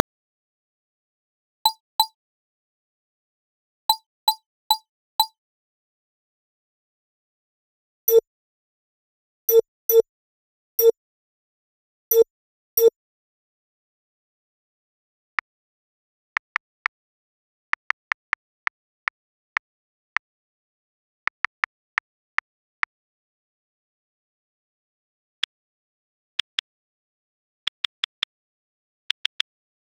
Sound effects > Electronic / Design
Sci-Fi Menu Toolkit
This pack contains original, fully synthesized sound effects created for a sci-fi user interface. It includes various button interactions (clicks, selections, toggles), menu navigation sounds (blips, transitions, feedback tones), and a few subtle background textures or ambient layers to support the overall menu atmosphere. All sounds are designed from scratch using synthesis and are not based on any real-world recordings. This is a free preview from my Sci-Fi UI Sound Pack — a small thank-you gift for the community. If you find these sounds useful, the full pack is available on a pay-what-you-want basis (from just $1). Your support helps me keep creating free and paid audio resources! 🔹 What’s included in the full pack?
button, clicks, design, digital, electronic, futuristic, interactive, interface, menu, navigation, sci-fi, SFX, synthesized, textures, UI